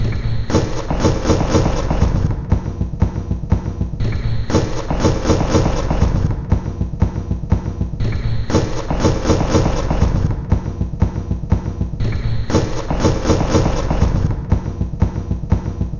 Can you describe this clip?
Instrument samples > Percussion
This 120bpm Drum Loop is good for composing Industrial/Electronic/Ambient songs or using as soundtrack to a sci-fi/suspense/horror indie game or short film.
Alien, Ambient, Dark, Drum, Industrial, Loop, Loopable, Packs, Samples, Soundtrack, Underground, Weird